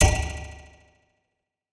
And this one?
Sound effects > Experimental
Zero-G Racquet Hit 6
energy-shield, energy-shield-collision, futuristic-sport, futuristic-sports, laser-racquet-hit, sci-fi-athletics, sci-fi-baseball, sci-fi-bludgeon-hit, sci-fi-collision, sci-fi-hit, sci-fi-racquet, sci-fi-racquet-hit, sci-fi-racquet-sports, sci-fi-sport, sci-fi-sports, sci-fi-sports-sounds, sci-fi-weapon, sci-fi-weapon-block, sci-fi-weapon-parry, space-travel-sport, sports-of-the-future, z-ball, zero-g-sports